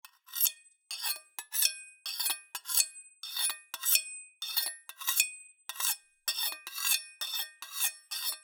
Sound effects > Objects / House appliances
A recording of a kitchen knife being scraped across a sharpener with various speeds and force.